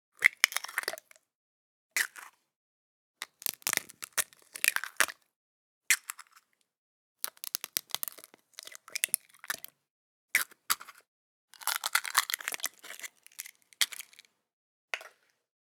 Sound effects > Objects / House appliances
Cracking Eggs
Cracking some eggs into a bowl. Recorded with a Zoom H2N.